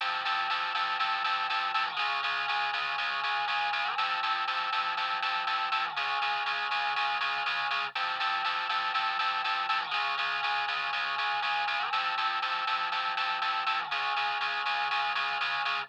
Music > Solo instrument
Guitar loops 124 09 verison 09 120.8 bpm
samples, music, bpm, electric, guitar, simplesamples, electricguitar, reverb, free, simple, loop